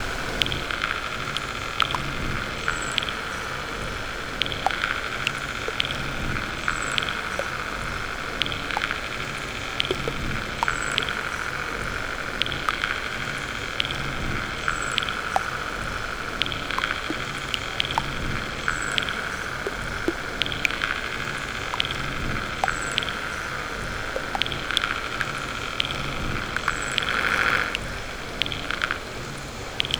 Sound effects > Electronic / Design
Dustmite Chorale 6
The 'Dustmites Chorale' pack from my 'Symbiotes' sampler is based on sounds in which the dominant feature is some form of surface noise, digital glitch, or tape hiss - so, taking those elements we try to remove from studio recordings as our starting point. This excerpt combines looped samples of light urban rainfall, and combines them with a more synthetic sort of 'droplet' sound courtesy of randomized FM wavelets.Some additional "industrial" atmosphere is provided here by a faint loop reduced to 4bit resolution and fed through comb filter feedback. Oddly relaxing!
tactile, noise, crackle, rain, dust, bit-reduction, industrial, wavelets, city, surface